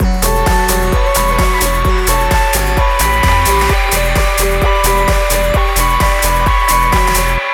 Music > Multiple instruments
Melodic Techno Beat Loop Demo Idea 128BPM
Loop Idea made in FL11